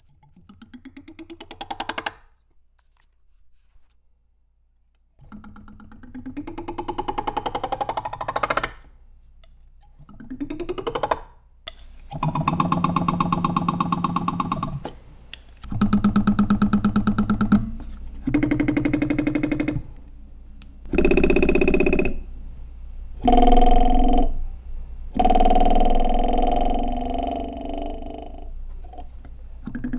Sound effects > Objects / House appliances
Metal Beam Plank Vibration Sequence
Metal, Klang, Clang, Beam, Wobble, metallic, Trippy, Foley, FX, Vibrate, SFX, ting, Perc, ding, Vibration